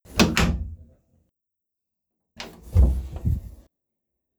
Sound effects > Objects / House appliances
Opening and Closing Door Sounds
Opening, Closing, Door